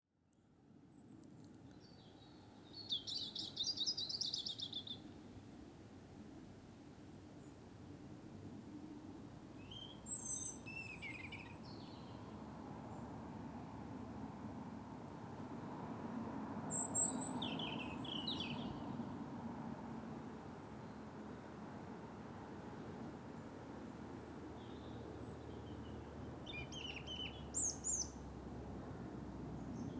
Soundscapes > Nature
Robins singing in the morning. Contains also the sounds of cars driving past.